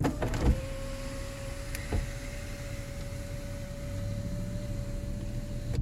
Sound effects > Vehicles
VEHDoor-Samsung Galaxy Smartphone Car, Electric Sunroof, Open Nicholas Judy TDC
An electric car sunroof opening.
electric, Phone-recording, car, open, sunroof